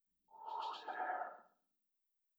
Speech > Processed / Synthetic
Recorded "Who's there" and distorted with different effects.
dark, distorted, halloween, horror, noise, sfx, vocal